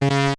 Sound effects > Experimental
Analog Bass, Sweeps, and FX-007
electronic, oneshot, bass, sample, synth, effect, vintage, pad, fx, alien, mechanical, analogue, retro, sfx, bassy, sci-fi, dark, analog, snythesizer, korg, sweep, scifi, basses